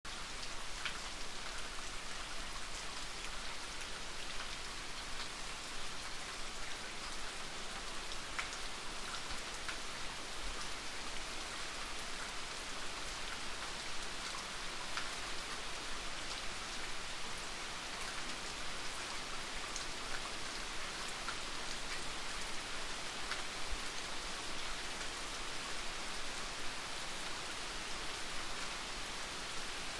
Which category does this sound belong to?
Sound effects > Natural elements and explosions